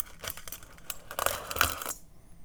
Sound effects > Other mechanisms, engines, machines
grinder wire brush foley-006
Brushing, Mechanical, sfx, Tool